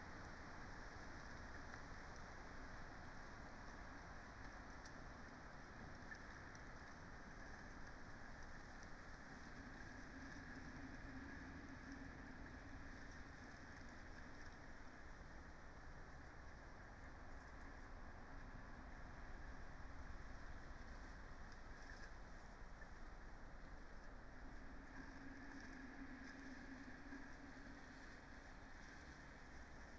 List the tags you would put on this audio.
Soundscapes > Nature
data-to-sound modified-soundscape natural-soundscape phenological-recording raspberry-pi

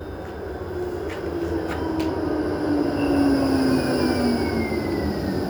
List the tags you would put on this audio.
Sound effects > Vehicles
Tram Vehicle Transportation

